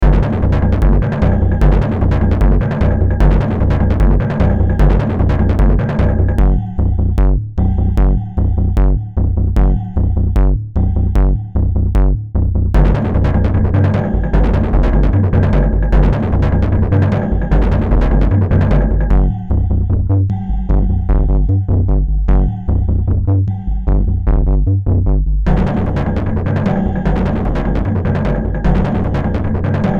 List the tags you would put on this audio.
Music > Multiple instruments
Industrial
Horror
Soundtrack
Noise

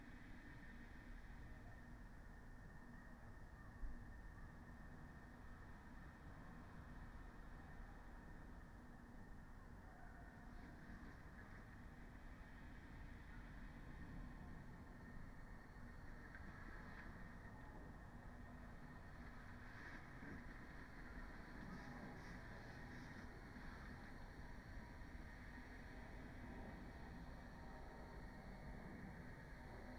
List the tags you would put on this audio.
Soundscapes > Nature
alice-holt-forest
artistic-intervention
data-to-sound
modified-soundscape
phenological-recording
raspberry-pi
sound-installation
soundscape

